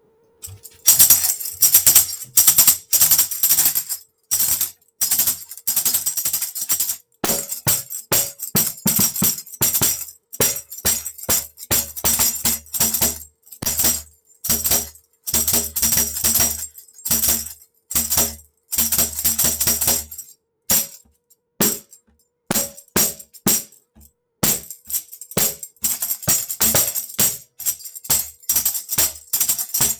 Instrument samples > Percussion
Rock-tambourine samples
some basic samples of Alex's tambourine